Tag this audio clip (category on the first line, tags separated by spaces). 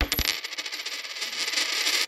Sound effects > Objects / House appliances
dime drop foley Phone-recording spin